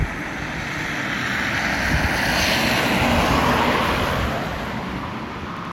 Soundscapes > Urban
auto11 copy
car, traffic, vehicle